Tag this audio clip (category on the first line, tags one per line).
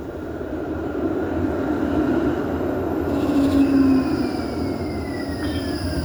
Sound effects > Vehicles
tram
transportation
vehicle